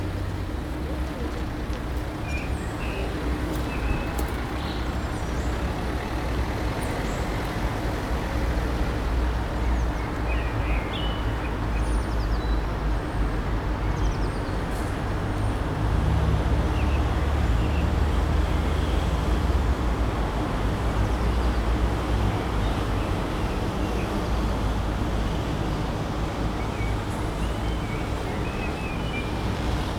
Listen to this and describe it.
Soundscapes > Urban
2025 04 11 18h08 - Quillan XY
Subject : XY recording of the bus stop center of Quillan Date YMD : 2025 04 11 18h08 Location : Quillan France. Hardware : Zoom H2n XY Weather : Processing : Trimmed and Normalized in Audacity. Notes : Facing north.
2025, Spring, Xy, April, Quillan, France